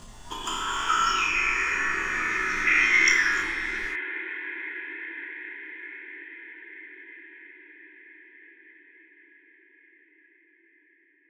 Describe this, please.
Sound effects > Experimental
Creature Monster Alien Vocal FX-29
Frightening, Vox, boss, Animal, sfx, Monster, Groan, Sounddesign, Monstrous, Alien, Growl, fx, gamedesign, Snarl, evil, visceral, Creature, Otherworldly, Fantasy, gutteral, Reverberating, Snarling, Deep, Ominous, Sound, Vocal, Echo, devil, demon, scary